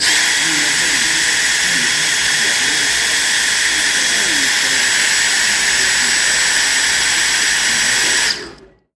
Sound effects > Objects / House appliances
A cordless drill driver starting, running and stopping.